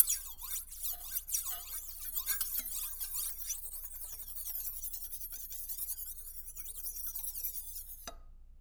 Sound effects > Objects / House appliances
knife and metal beam vibrations clicks dings and sfx-071
Beam, Clang, ding, Foley, FX, Klang, Metal, metallic, Perc, SFX, ting, Trippy, Vibrate, Vibration, Wobble